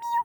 Sound effects > Animals
Cat Meow #2
Cat sound I made for a videogame, shout out to my girlfriend for voicing it. Pitch shifted a little.
cat, meow